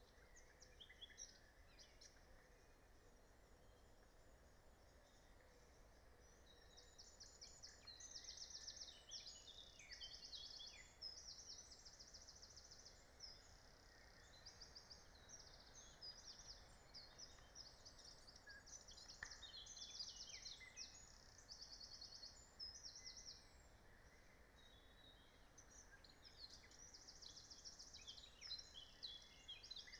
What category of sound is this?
Soundscapes > Nature